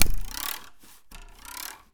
Sound effects > Other mechanisms, engines, machines
metal shop foley -135
bam, bang, boom, bop, crackle, foley, fx, knock, little, metal, oneshot, perc, percussion, pop, rustle, sfx, shop, sound, strike, thud, tink, tools, wood